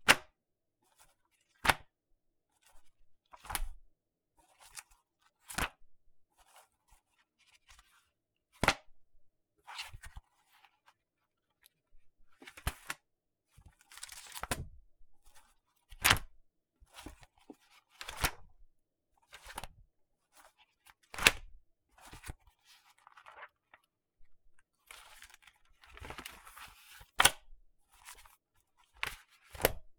Sound effects > Objects / House appliances
Picking up and dropping a small sketchbook on a countertop multiple times.